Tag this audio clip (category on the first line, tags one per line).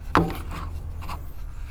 Sound effects > Other mechanisms, engines, machines
perc
boom
sfx
metal
foley
percussion
rustle
bang
bop
oneshot
tink
fx
shop
sound
crackle
bam
strike
wood
little
thud
tools